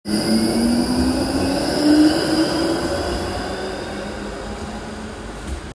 Sound effects > Vehicles
tram rain 17
motor, rain